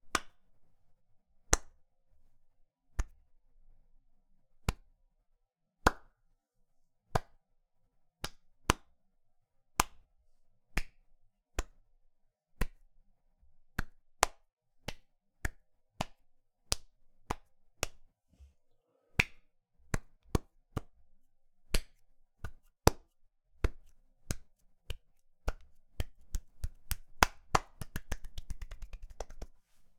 Sound effects > Human sounds and actions
Soft Hand Impacts
The sound of the palm of a hand lightly colliding with something. Not quite as light as a pat, not quite as hard as a slap. Useful for layering into other sound effects.
clap, hand, movement, palm, pat, patting, pet, petting, slap